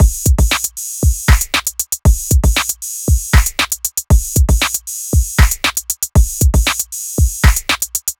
Music > Other
Pop Drum Beat 117 BPM
Drum beat created in Logic Pro X.
Beat, Drummachine, Drums, Pop